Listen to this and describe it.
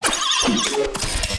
Sound effects > Experimental
Gritch Glitch snippets FX PERKZ-008
perc, lazer, laser, edm, impact, crack, percussion, whizz, pop, impacts, hiphop, abstract, idm, otherworldy, clap, zap